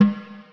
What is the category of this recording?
Music > Solo percussion